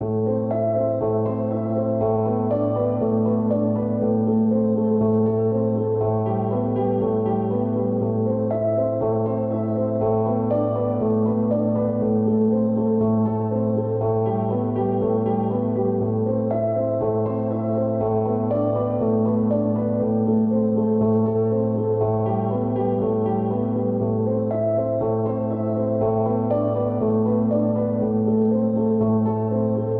Music > Solo instrument
Piano loops 064 efect 4 octave long loop 120 bpm
120, 120bpm, free, loop, music, piano, pianomusic, reverb, samples, simple, simplesamples